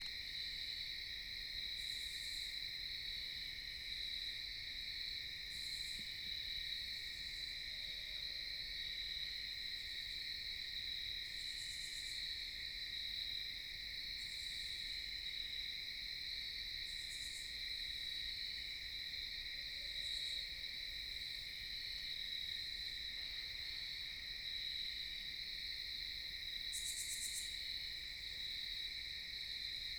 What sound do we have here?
Soundscapes > Nature
Mostly Crickets
Mostly Just Crickets at Night. Recorded with a ZOOM H6 and a Sennheiser MKE 600 Shotgun Microphone. Go Create!!!
Night, Atmosphere, Crickets, Ambience